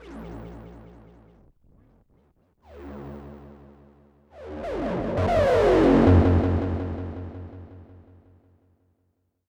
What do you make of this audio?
Sound effects > Experimental
Analog Bass, Sweeps, and FX-066
pad, sci-fi, machine, effect, sample, robot, oneshot, synth, retro, korg, weird, snythesizer, complex, analogue, dark, alien, scifi, basses, fx, vintage, analog, electronic, bass, electro, mechanical, sweep, trippy, sfx, robotic, bassy